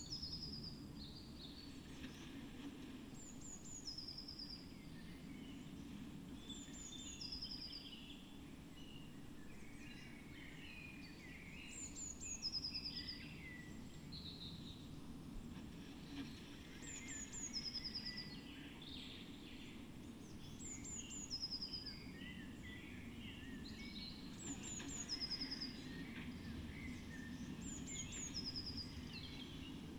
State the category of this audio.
Soundscapes > Nature